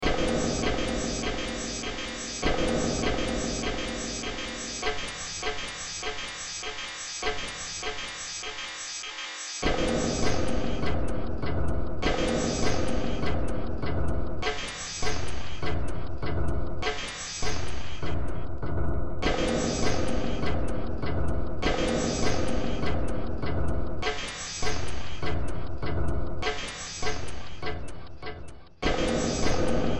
Music > Multiple instruments
Short Track #3811 (Industraumatic)
Industrial, Cyberpunk, Soundtrack, Games